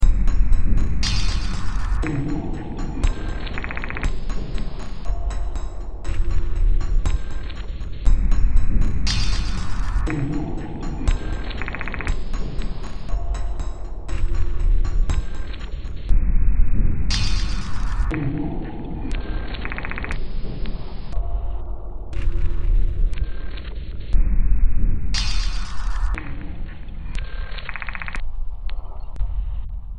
Music > Multiple instruments
Demo Track #3583 (Industraumatic)
Cyberpunk, Industrial, Underground, Games